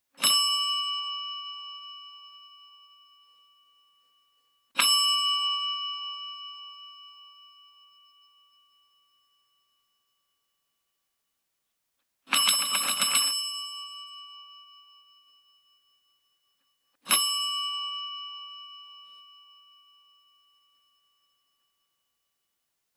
Sound effects > Objects / House appliances
Call Bell
Ringing a call-bell from a fast-paced card trading game! Can't remember the name, but it was fun! Recorded in the Jackbox Games office on November 12th, 2025
counter, bell, ringing, call